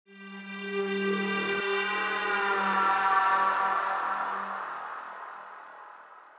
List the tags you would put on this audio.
Sound effects > Electronic / Design
sfx
efx
fx
sound
abstract
effect
electric
sci-fi
psytrance
psyhedelic
psy
soundeffect
sound-design
sounddesign

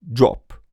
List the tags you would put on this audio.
Speech > Solo speech
un-edited
singletake

